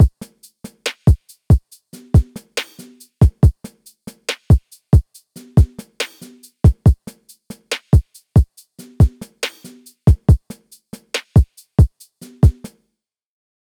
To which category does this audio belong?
Instrument samples > Percussion